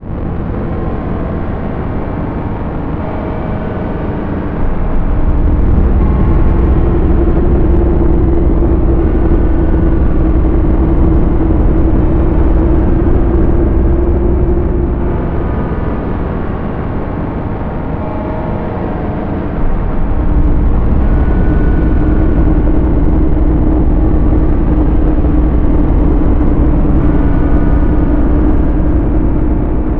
Soundscapes > Synthetic / Artificial
Eclipsis Saw Dronescape
A Menacing, metallic Drone. Created using VST Zone's monstrous Ambient Synth Eclipsis being Modulated by H.G Fortune's Atonoise FX unit to create a Dark and foreboding Soundscape.